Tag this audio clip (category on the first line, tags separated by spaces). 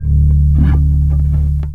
Instrument samples > String
bass blues charvel electric funk fx loop loops mellow oneshots pluck plucked riffs rock slide